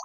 Sound effects > Electronic / Design
A short click sound I recorded and processed with Audacity
button, click, short, switch